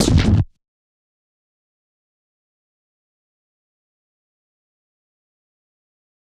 Sound effects > Experimental
dark verb impact 6
crack, edm, pop, hiphop, alien, laser, percussion, abstract, fx, glitch, impacts, clap, sfx, idm, zap, snap, lazer, whizz, perc, impact, glitchy, otherworldy, experimental